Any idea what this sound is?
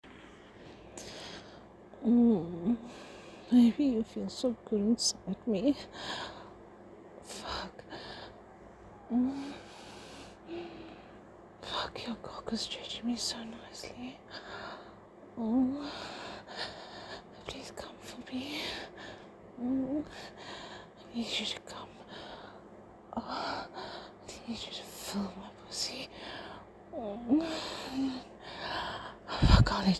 Sound effects > Human sounds and actions
Female encouraging man
Man, talk, woman